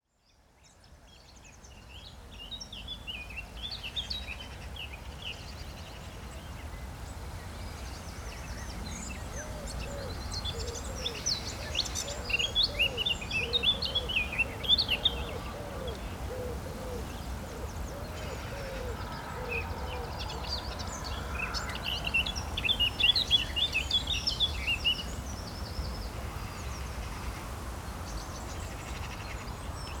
Soundscapes > Nature
A morning recording at Gerntleshaw Common, Staffordshire.
ambience, birds, field-recording, nature, wind